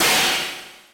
Instrument samples > Percussion
Magical Cymbal8
Synthed only with a preset of the Pacter Plugin in FLstudio Yes,only the preset called '' Cymbalism '' I just twist the knobs a night then get those sounds So have a fun!